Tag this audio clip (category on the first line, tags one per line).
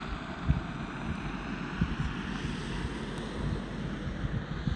Soundscapes > Urban
driving
tyres
car